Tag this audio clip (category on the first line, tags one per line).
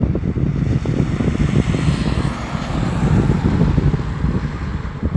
Soundscapes > Urban
city
driving
car
tyres